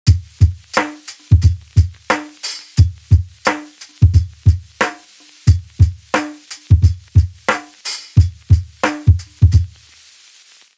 Music > Solo percussion
bb drum break loop spri 89
A short set of Acoustic Breakbeats recorded and processed on tape. All at 89BPM
Vinyl, Acoustic, DrumLoop, Lo-Fi, Drum-Set, Drum, Dusty, Break, Breakbeat, Vintage, 89BPM, Drums